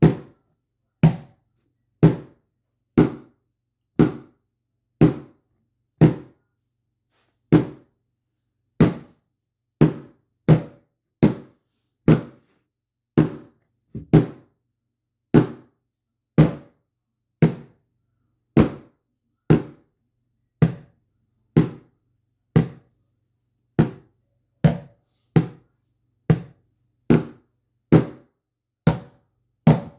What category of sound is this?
Music > Solo percussion